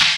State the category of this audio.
Instrument samples > Percussion